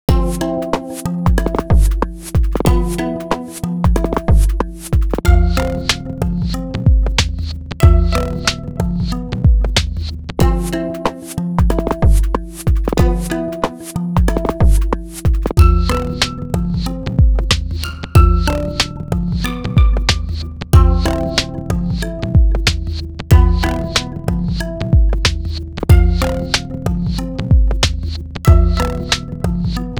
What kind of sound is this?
Music > Multiple instruments
Chromatonic beat, Trip Hop 93bpm
a flowy chill trip hop beat and melody created with chromaphone and mictronic in fl studio